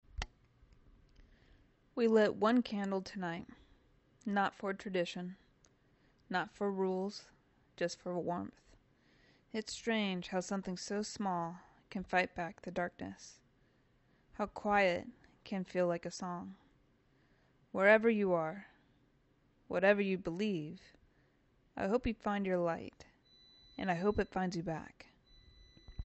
Speech > Solo speech
“One Candle” (gentle / spiritual / universal)
A soft, inclusive message about hope, light, and finding peace during the holiday season. We lit one candle tonight. Not for tradition. Not for rules. Just for warmth. It’s strange how something so small can fight back the dark. How quiet can feel like a song. Wherever you are—whatever you believe— I hope you find your light. And I hope it finds you back.
script, universalmessage, holidaypeace